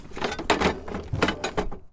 Sound effects > Objects / House appliances
Junkyard Foley and FX Percs (Metal, Clanks, Scrapes, Bangs, Scrap, and Machines) 32
Metal, Machine, dumping, FX, Metallic, Environment, Foley, tube, Bash, Junk, trash, Bang, Clang, Perc, Junkyard, Atmosphere, Robot, SFX, rattle, scrape, Ambience, dumpster, Clank, garbage, Smash, waste, Percussion, Dump, rubbish, Robotic